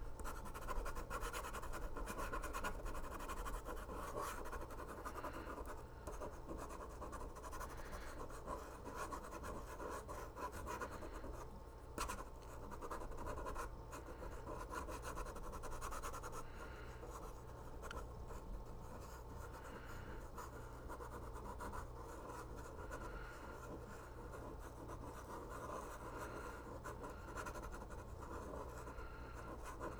Sound effects > Objects / House appliances
OBJWrite-Blue Snowball Microphone Rolling Ball Pen, Writing Nicholas Judy TDC
A rolling ball pen writing.